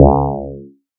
Instrument samples > Synths / Electronic
DUCKPLUCK 1 Db
bass, fm-synthesis, additive-synthesis